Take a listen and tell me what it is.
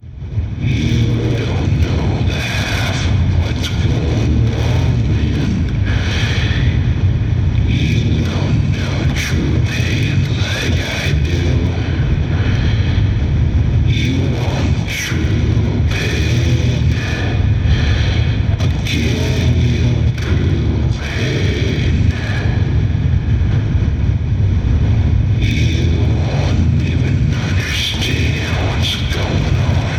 Sound effects > Human sounds and actions
shit faced - True Pain
Here is a voice sound I made using my deepest tone in my voice with a few effects, I hope you like it?
Badass, Creepy, Scary, Voiceover